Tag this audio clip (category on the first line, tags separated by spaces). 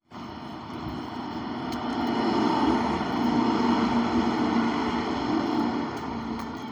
Sound effects > Vehicles
drive tram vehicle